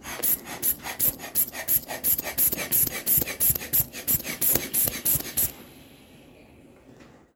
Sound effects > Objects / House appliances
OBJMed-Samsung Galaxy Smartphone, CU Rapid Blood Pressure Cuff Air Pumps, Quick Release Nicholas Judy TDC
Rapid blood pressure cuff air pumps and a quick release. Recorded at Cold Harbor Family Medicine.
air, Phone-recording, quick, foley, release, rapid, pump, blood-pressure-cuff